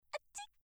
Sound effects > Human sounds and actions
little girl sneezes
It's a sound created for the game Dungeons and Bubbles for The Global Game Jam 2025.
baby, child, foley, fxs, game, girl, human, kid, little, sneezes, voice